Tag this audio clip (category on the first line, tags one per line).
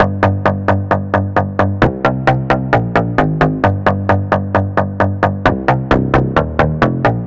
Music > Solo instrument
string
bass
guitar
pluck